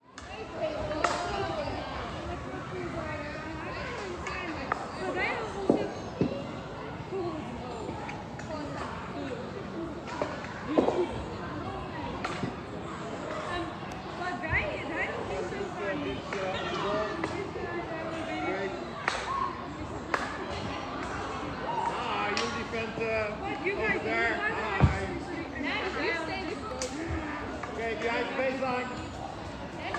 Soundscapes > Urban
Dutch hockey girls being trained by a male coach. Recorded in the afternoon with an iPhone 16 in stereo.